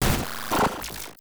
Sound effects > Electronic / Design
One-shot Glitch SFX.
digital, glitch, hard, one-shot, pitched, stutter